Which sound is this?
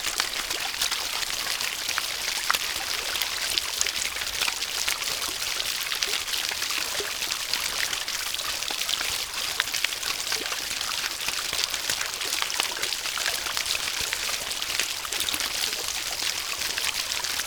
Sound effects > Natural elements and explosions
250710 20h26 Esperaza Place de la laïcité - NW edge -Close-up of Top of western fountain - MKE600
Subject : Recording the fountain from Place de la laicité in Esperaza. Here focusing on the top of the western tower. Sennheiser MKE600 with stock windcover P48, no filter. Weather : Processing : Trimmed in Audacity.
Shotgun-mic, Shotgun-microphone, 11260, Esperaza, close-up, FR-AV2, MKE600, July, Aude, Tascam, Single-mic-mono, MKE-600, Juillet, water, Hypercardioid, 2025, output, Sennheiser, Fountain